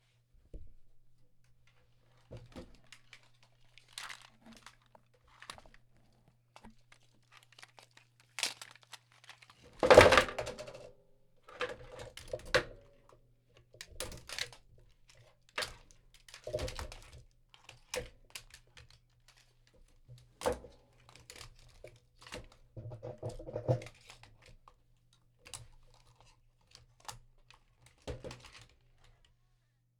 Sound effects > Objects / House appliances
This is a sound effect I used for someone dropping an armful of weapons on a tabletop to sort through. Recorded in studio, no background sound.